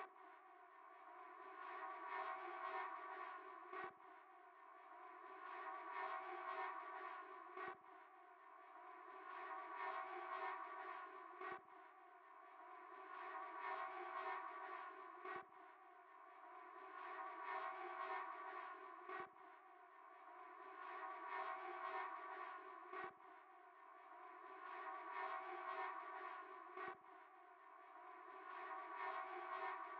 Instrument samples > Synths / Electronic
I synthesize sounds, textures, rhythmic patterns in ableton. Use it and get high.✩♬₊˚. These are sounds from my old synthesis sketches.
electronic, loop, sound-design, minimal, sound